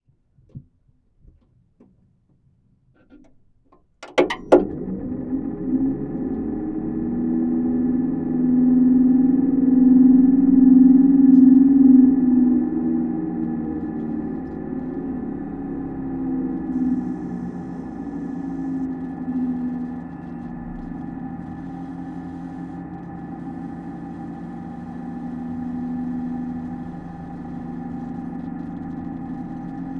Sound effects > Electronic / Design
CMPTMisc-Contact Mic Computer power on SoAM Sound of Solid and Gaseous Pt 1
Power-on, mechanical, PC, load, computer, hard-drive